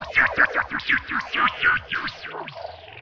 Soundscapes > Synthetic / Artificial
LFO Birdsong 38
massive, Birdsong, LFO